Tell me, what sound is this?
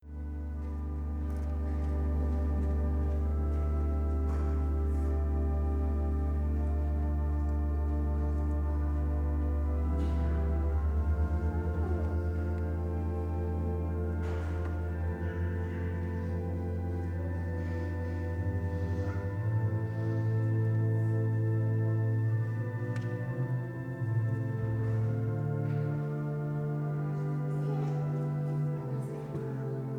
Music > Solo instrument
008A 100111 0139-1 FR Music Holy Mass La Lucerne
Music from a Holy Mass in La Lucerne Abbey (11th file). Please note that this audio file has kindly been recorded by Dominique LUCE, who is a photographer. Fade in/out applied in Audacity.